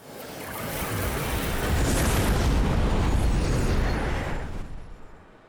Sound effects > Electronic / Design
Made through pigments Synth sampling capabilities with different automations for each layers, but most of the sounds are processed through more that 5+ plugins From AIR, GRM And Arturia. The Sound Mostly Shows an ascending Dark Power Gathering Mana then Blast something with more magical layers at the end, I Believe this is one of those sounds that can be altered in many different ways but be wary with the layers that you choose